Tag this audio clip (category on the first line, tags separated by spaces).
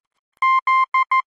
Sound effects > Electronic / Design
Language Telegragh